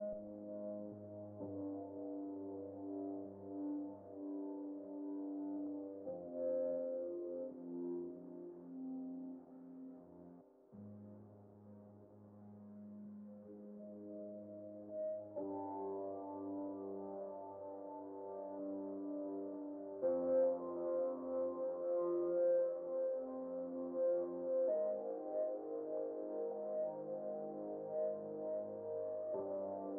Music > Multiple instruments
recorded with Fl Studio 10